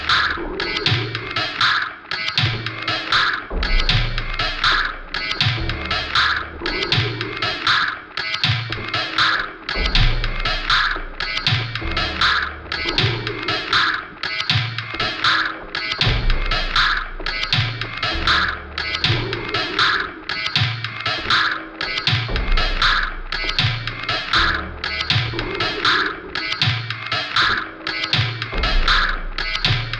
Music > Other
Electronic Music Drum Loop
This is a loop Generated with Kontakt 8 in the LUNA DAW and captured into a sampler